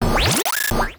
Sound effects > Electronic / Design
One-shot Glitch SFX.
digital, Glitch, hard, one-shot, pitched, stutter